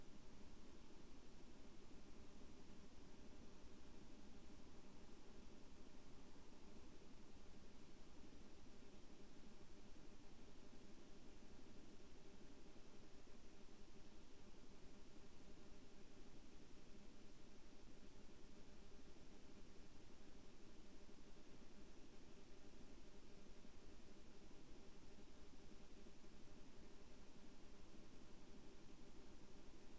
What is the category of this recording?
Soundscapes > Nature